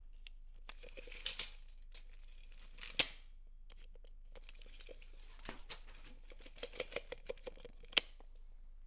Sound effects > Other mechanisms, engines, machines
tape measure foley
fx, Tapemeasure, Shop, Mechanical, Tape, Scrape, Woodshop, Tools, sfx, Metallic, Foley, Workshop, Tool, Household